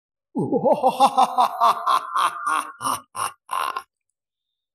Sound effects > Human sounds and actions
Ghost horror evil laugh
Ghost Scary evil laugh Laughing ghost monster Fear
laughter, Zombie, fear, Creature, laugher, free, human, haunted, Slow, laugh, Scream, Growl, male, man, Monster, evil, Roar, voice, demonic, ghost, laughing, funny, horror, Scary